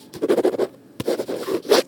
Sound effects > Human sounds and actions
This is a sound I created using a pen to sign a piece of paper with a hard background. Recorded with an Audio Technica AT875R in to a PreSonus revelator io24, and edited in studio one.